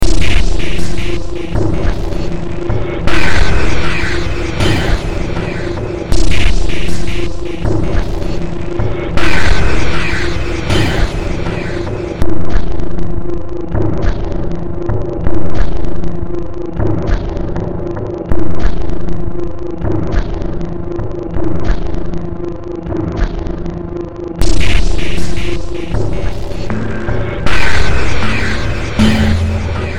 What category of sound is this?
Music > Multiple instruments